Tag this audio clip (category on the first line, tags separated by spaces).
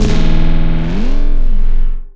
Instrument samples > Synths / Electronic
subbass subs stabs drops subwoofer low wavetable synthbass sub lowend bassdrop lfo wobble synth clear bass